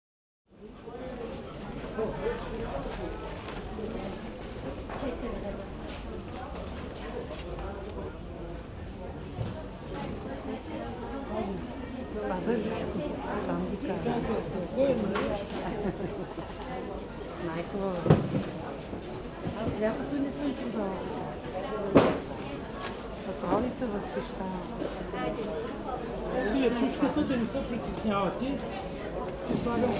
Soundscapes > Urban
Sophia open air market
Sophia open air vegetable market, morning time People, men an women are talking , child, movements. Not many people, Anybody knows what people say?
Bulgaria, field-recording, market, people, Sophia, talking, voices